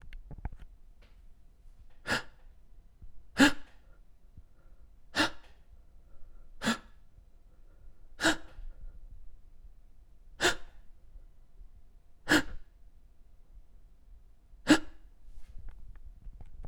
Sound effects > Human sounds and actions
Frightened person exclaiming "Ha!" in a critical moment of fear or surprise. Recorded stereo on ZoomH4.